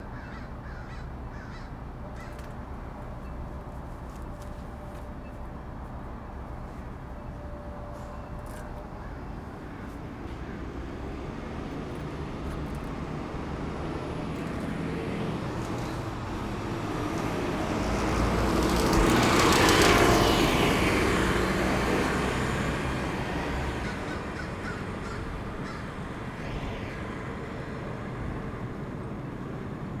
Soundscapes > Urban
AMBSubn a morning feeding crows DPA6060st
Someone in my neighborhood feeds a large group of crows that hang out in a parking lot early in the morning, so I had them wear some mics as they did that as well as walking around the area feeding various other small groups of crows. This person is not a recordist so you can hear them doing things like throwing the food and so on. I have attempted to go with them to record, but the crows don't care for that so much. Recordist: MH Recorder: Lectrosonics SPDR Microphones: DPA 6060s worn on head
traffic, caw, ambience, life, crows, birds, outside, residential, field-recording, urban, city